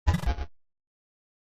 Sound effects > Electronic / Design
Glitch (Faulty Core) 1
audio-glitch, audio-glitch-sound, audio-glitch-sound-effect, computer-error, computer-error-sound, computer-glitch, computer-glitch-sound, computer-glitch-sound-effect, error-fx, error-sound-effect, glitch-sound, glitch-sound-effect, machine-glitch, machine-glitching, machine-glitch-sound, ui-glitch, ui-glitch-sound, ui-glitch-sound-effect